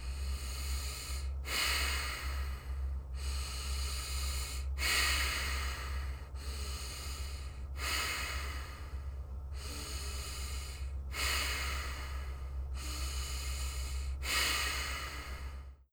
Sound effects > Human sounds and actions
HMNSnor-Blue Snowball Microphone Snoring, Breathing, Nose Nicholas Judy TDC
Blue-brand, Blue-Snowball, breath